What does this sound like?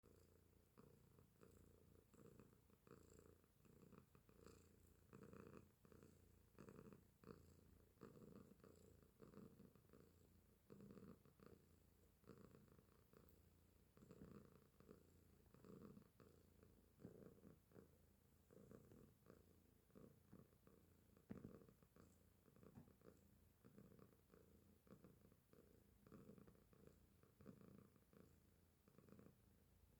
Sound effects > Animals
Purring Tomcat
Cute relaxing purring sound from a big tomcat